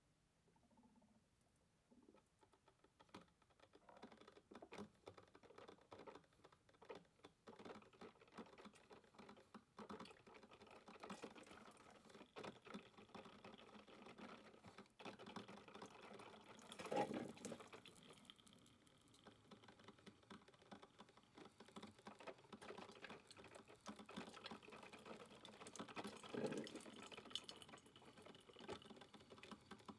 Sound effects > Objects / House appliances
This is oddly soothing.